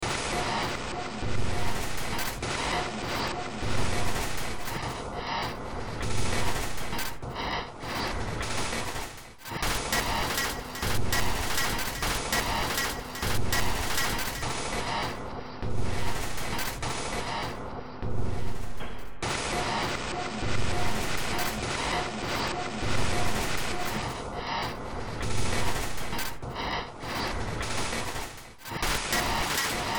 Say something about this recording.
Music > Multiple instruments
Short Track #3731 (Industraumatic)
Ambient, Cyberpunk, Games, Horror, Industrial, Noise, Sci-fi, Soundtrack, Underground